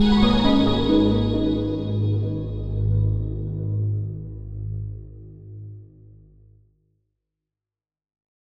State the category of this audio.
Music > Multiple instruments